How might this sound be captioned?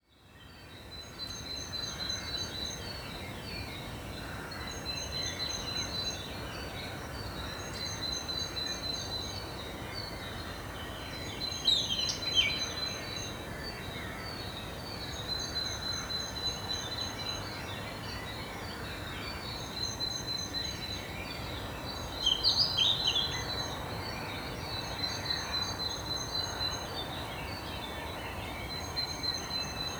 Soundscapes > Nature
The 'Dawn Chorus' can be heard on May 11, 2025, in Germany, Bavaria, Munich, Trudering early in the morning from 5:30 AM. Recorded in the urban area, mainly many different birds can be heard. The city with its S-Bahn trains, a bit of traffic, and very faintly, a cat can be heard. However, all of this is rather in the background.